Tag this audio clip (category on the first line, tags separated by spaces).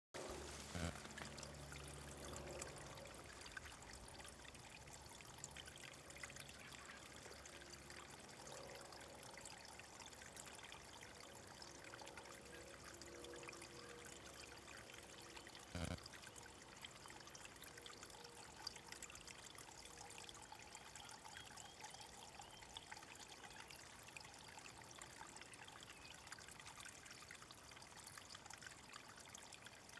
Soundscapes > Nature
Mildura soundscape wind